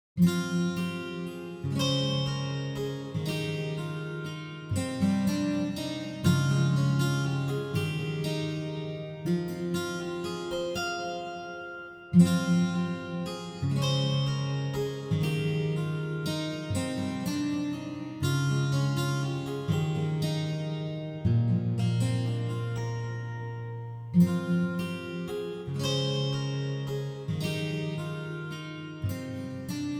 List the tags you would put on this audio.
Music > Solo instrument
bardic-melody
bard-plays-guitar
bards-melody
dungeons-and-dragons-melody
gentle-guitar
gentle-guitar-melody
roleplaying-game-melody
rpg-game-melody
rpg-inn-ambience
rpg-inn-theme
rpg-melody
rpg-music
rpg-music-theme
rpg-tavern-music
rpg-tavern-music-theme
soothing-guitar
soothing-guitar-melody
tavern-guitar
tavern-melody
tavern-music-theme

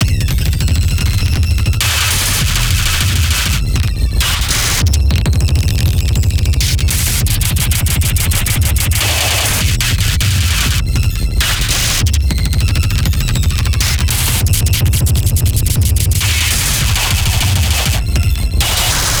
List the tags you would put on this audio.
Music > Multiple instruments
200bpm
android
artificial
cyber
distorted
distortion
machine
mechanical
noise
robot
robotic
sci-fi
sfx

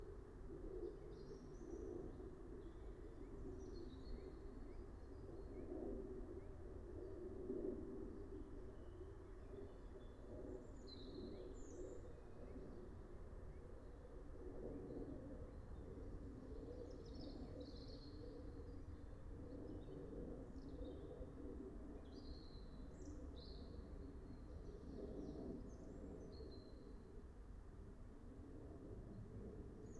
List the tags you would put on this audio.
Soundscapes > Nature
soundscape
raspberry-pi
nature
field-recording
natural-soundscape

